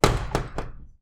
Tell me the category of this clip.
Sound effects > Objects / House appliances